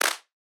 Instrument samples > Synths / Electronic
Clap one-shot made in Surge XT, using FM synthesis.
electronic, surge